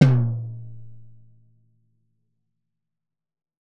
Music > Solo percussion
Med-low Tom - Oneshot 17 12 inch Sonor Force 3007 Maple Rack
Medium-Tom, wood